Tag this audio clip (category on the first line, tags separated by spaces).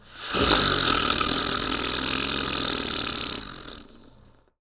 Sound effects > Objects / House appliances
blast Blue-brand Blue-Snowball cartoon comical cream whip whip-cream whipped whipped-cream